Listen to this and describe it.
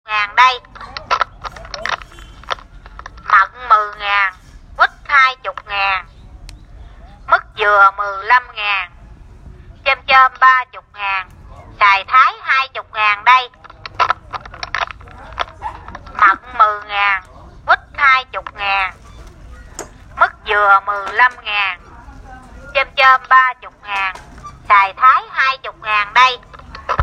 Speech > Solo speech
Woman sell fruit say 'Hồng 10 ngàn, huýt 20 ngàn, mít dừa 15 ngàn, chôm chôm 30 ngàn, xoài Thái 20 ngàn đây'. Record use iPhone 7 Plus smart phone 2025.12.31 08:11
business, female, fruit, sell, viet, voice, woman